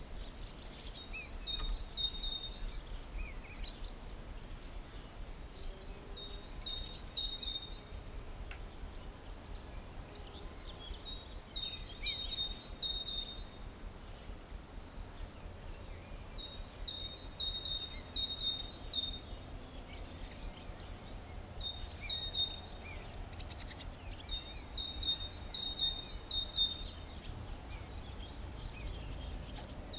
Sound effects > Animals
250427-16h04 Gergueil - Great Tit singing
Subject : A Bird Date YMD : 2025 04 27 16h04 Location : Gergueil France. Hardware : Zoom H5 stock XY capsule. Weather : Processing : Trimmed and Normalized in Audacity.
2025, msange, Outdoor, Spring, Zoom, April, Great, tit, Ambience, Village, bird, XY, Gergueil, Rural, H5